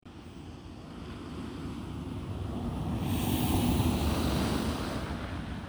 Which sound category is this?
Sound effects > Vehicles